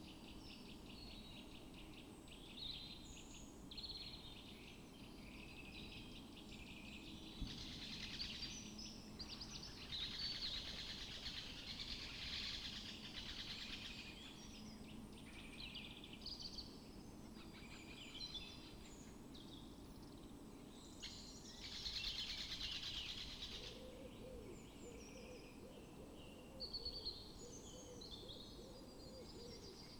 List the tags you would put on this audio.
Soundscapes > Nature
raspberry-pi
weather-data
natural-soundscape
modified-soundscape
sound-installation
phenological-recording
alice-holt-forest
artistic-intervention
Dendrophone
field-recording
soundscape
nature
data-to-sound

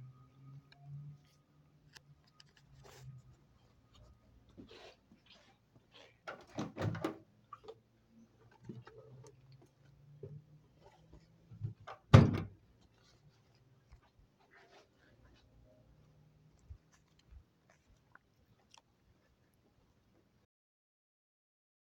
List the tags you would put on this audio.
Sound effects > Objects / House appliances
appliance
cooking
door
kitchen
microwave
oven

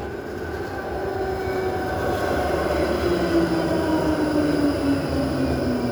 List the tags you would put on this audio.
Sound effects > Vehicles
tram
transportation
vehicle

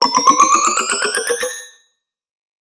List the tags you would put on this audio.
Sound effects > Electronic / Design
game
ui
interface

